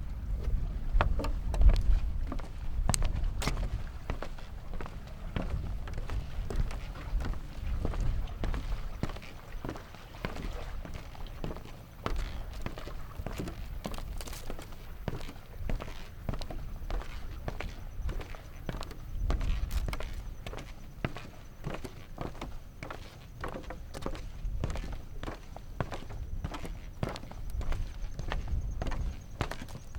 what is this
Sound effects > Human sounds and actions
FEETHmn-Exterior worn sneakers on old, rickety, wooden boardwalk over marshland, walking pace QCF Gulf Shores Alabama Zoom F3 with Rode NTG2
worn sneakers on old, rickety, wooden boardwalk over marshland, walking pace